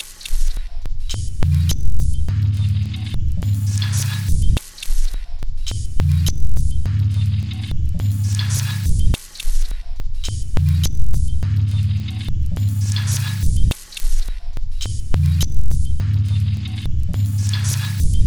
Instrument samples > Percussion
This 105bpm Drum Loop is good for composing Industrial/Electronic/Ambient songs or using as soundtrack to a sci-fi/suspense/horror indie game or short film.
Drum, Loopable, Dark, Ambient, Underground, Loop, Weird, Packs, Soundtrack, Industrial, Alien, Samples